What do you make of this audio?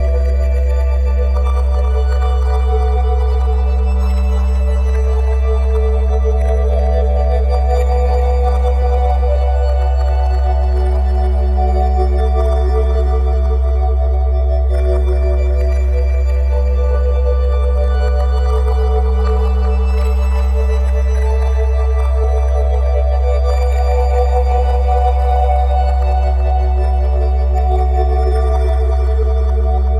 Music > Other
Granular Ambient Landscape in C Major at 120bpm
Granular ambient lanscape A C major chord played with the guitar is used to extrapolate both the background and the grains Done with Torso S4 Guitar sample recorded directly on Torso S4 input mic
C-major, ambient, soundscape, loop, background, landscape, 120-bpm, torso, Cmajor, 120bpm, granular, torso-s4, guitar